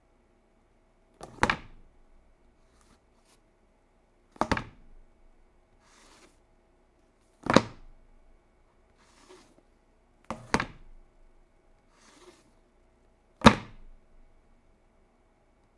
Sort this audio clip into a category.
Sound effects > Objects / House appliances